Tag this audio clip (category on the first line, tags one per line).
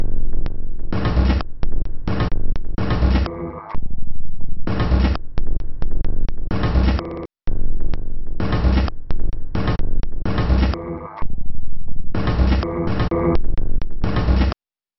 Instrument samples > Percussion
Dark
Ambient
Alien
Samples
Packs
Weird
Drum
Loopable
Loop
Underground
Soundtrack
Industrial